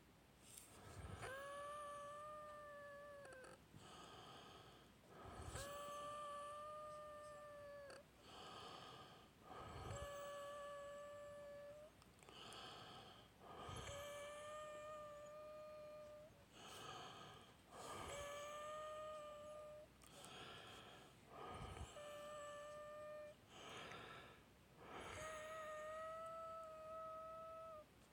Sound effects > Human sounds and actions
A sick person can not breathe.